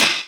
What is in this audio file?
Instrument samples > Percussion
crash Sabian low-pitched 1 short
boom, clang, crack, flangcrash, low-pitched, Meinl, metal, metallic, Paiste, sinocrash, sizzle, UFIP, Zildjian